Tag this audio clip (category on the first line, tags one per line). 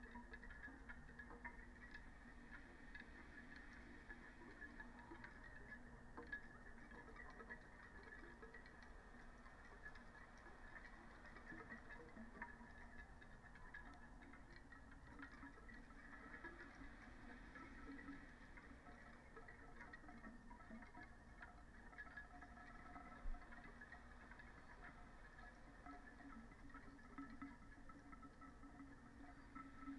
Soundscapes > Nature
field-recording
weather-data
nature
artistic-intervention
phenological-recording
modified-soundscape
raspberry-pi
Dendrophone
alice-holt-forest
sound-installation
natural-soundscape
data-to-sound
soundscape